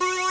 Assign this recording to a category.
Sound effects > Other